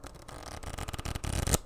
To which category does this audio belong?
Sound effects > Objects / House appliances